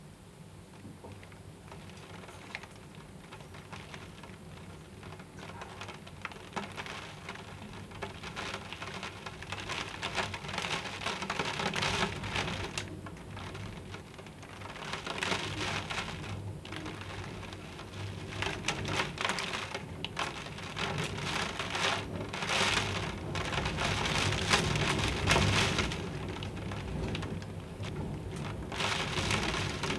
Soundscapes > Indoors
Wind & Rain on Window
Recording of a stormy night with rain lashing against the window. Recorded on phone.
atmospheric, field-recording, rain, rain-on-window, storm, stormy, weather, wind-howling